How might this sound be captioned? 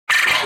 Sound effects > Animals
Fowl - Japanese Quail; Trill, Close Perspective
A Japanese quail crowing that was recorded using an LG Stylus 2022. The sound was cut-off from the start, but I couldn't help that, and there is possibly a fan in the background at the end.
poultry, quail, farm, barnyard, fowl, bird, animal, gamebird